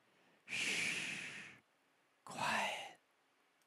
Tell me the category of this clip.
Speech > Solo speech